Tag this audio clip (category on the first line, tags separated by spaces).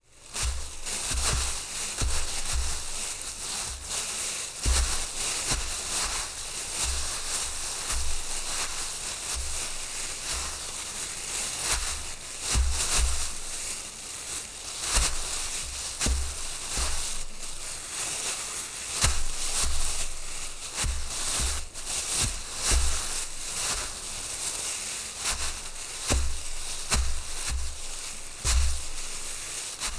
Sound effects > Objects / House appliances
bag Blue-brand Blue-Snowball element foley movement plastic tumble wind